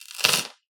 Sound effects > Objects / House appliances
Chains Drop 1 SFX
Dropping jewellery chains and necklaces in various thicknesses, recorded with an AKG C414 XLII microphone.
Chain, Jewellery, Necklace